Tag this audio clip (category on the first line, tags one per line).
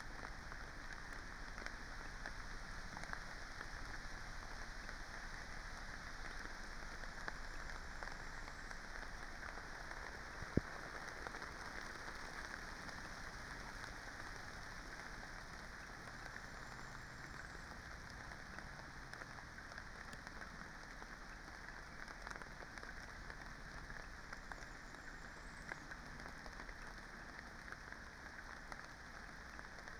Soundscapes > Nature
nature
field-recording
soundscape
natural-soundscape
alice-holt-forest
modified-soundscape
artistic-intervention
Dendrophone
weather-data
raspberry-pi
data-to-sound
sound-installation
phenological-recording